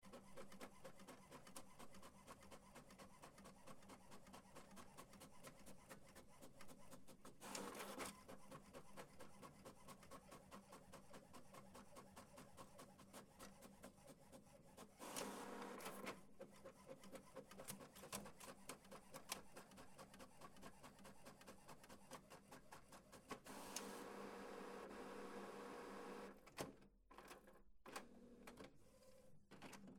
Sound effects > Objects / House appliances
HP Printer - Printing 3
Recorded on TASCAM - DR-05X; My HP printer at home, printing.
print, printer, hp, printing